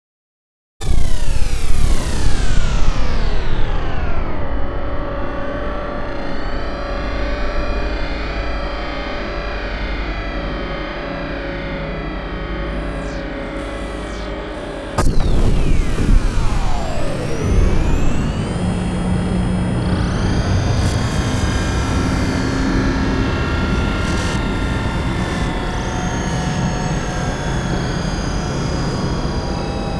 Sound effects > Other
Deep Space Resonance
A deep, low-frequency drone with sub-bass resonance, evoking the hum of a massive spaceship engine or the ominous presence of unknown cosmic forces. Perfect for sci-fi atmospheres, space exploration, or suspenseful futuristic settings. Effects recorded from the field. Recording gear-Tascam Portacapture x8 and Microphone - RØDE NTG5 Native Instruments Kontakt 8 REAPER DAW - audio processing
ambient, dark, drone, energy, sci-fi, spaceship, sub-bass, tension